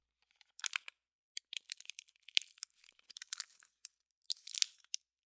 Sound effects > Objects / House appliances
up close personal foil wrapper-002
foley perc sfx fx percussion sample field recording
foley, recording, sfx, percussion, sample, perc, fx, field